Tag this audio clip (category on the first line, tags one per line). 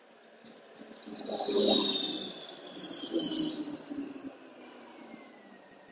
Sound effects > Vehicles
city
Tram
urban